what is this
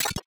Instrument samples > Percussion
Glitch-Perc-Glitch Cymbal 5
Just retouched some cymbal sample from FLstudio original sample pack. Ramdomly made with Therapy, OTT, Fruity Limiter, ZL EQ.
FX, Effect, Cymbal, Digital, Glitch